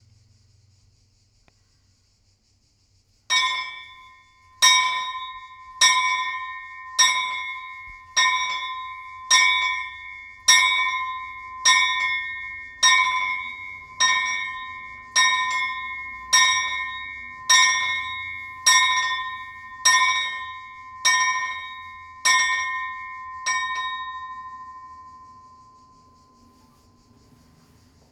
Soundscapes > Other
Chapel bells Pistoia Italy
Bells of a small chapel outside of Pistoia, Italy. Recorded on Zoom H1.
Bells, chapel, field-recording, Italy